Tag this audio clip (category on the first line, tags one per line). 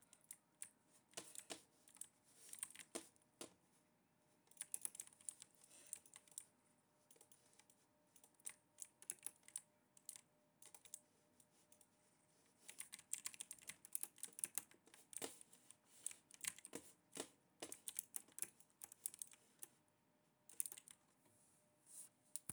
Sound effects > Other mechanisms, engines, machines
Gamer
Keyboard